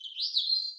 Sound effects > Animals
A recording of a robin. Edited using RX11.